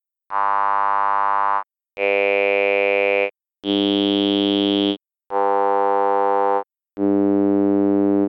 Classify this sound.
Sound effects > Electronic / Design